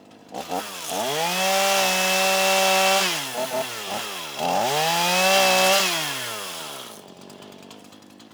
Sound effects > Other mechanisms, engines, machines
Small Chainsaw 2
A small chainsaw cutting down overgrown bushes. Rode NTG-3 (with Rycote fuzzy) into Sound Devices MixPre6. Recorded June 2nd, 2025, in Northern Illinois.
chainsaw, firewood, lumberjack, tree